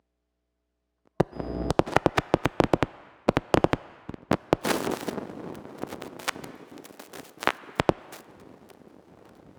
Instrument samples > Other
acoustic di, bad cable noise